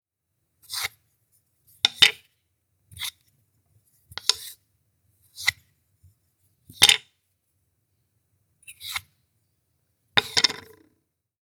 Sound effects > Objects / House appliances
KITCH Cinematis CutlerySpoonWood PickUpPutDown DeskCeramic Normal 02 Freebie
A wooden spoon hits the ceramic tile. This is one of several freebie sounds from my Random Foley | Vol. 3 | Cutlery pack. This new release is all about authentic cutlery sounds - clinks and taps on porcelain, wood, and ceramic.
Cutlery, effects, Foley, Freebie, handling, PostProduction, recording, Sound, spoon, wood